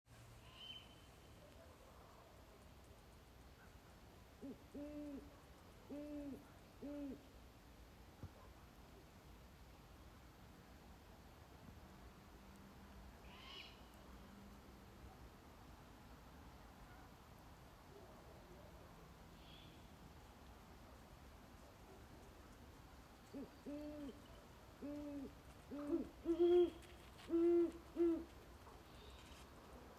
Soundscapes > Nature
Great horn owls and barn owl and Ruru, clear owls 08/15/2022
Great horn owls and barn owl and Ruru, clear owls